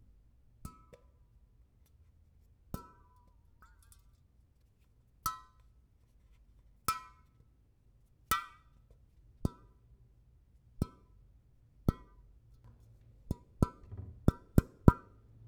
Sound effects > Objects / House appliances
Hitting a spray can with fingers while shaking it. The result is a funny wobbly frequency. Recorded with Zoom H2.